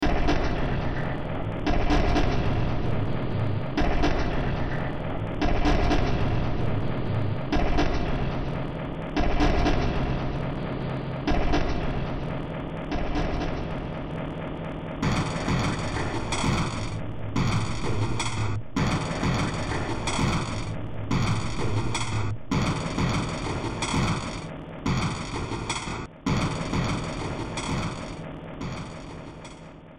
Music > Multiple instruments
Demo Track #3433 (Industraumatic)
Ambient, Cyberpunk, Games, Horror, Industrial, Noise, Sci-fi